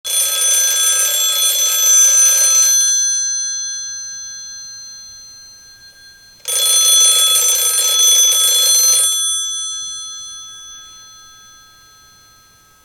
Sound effects > Objects / House appliances
Old Phone Ring
Old fashioned phone ringing
ring, phone, telephone, ringing